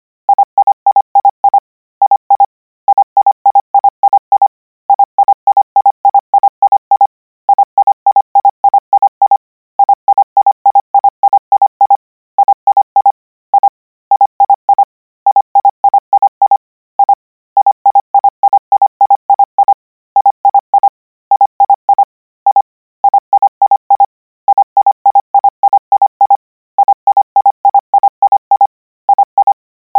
Sound effects > Electronic / Design
Koch 12 I - 200 N 25WPM 800Hz 90%
Practice hear letter 'I' use Koch method (practice each letter, symbol, letter separate than combine), 200 word random length, 25 word/minute, 800 Hz, 90% volume.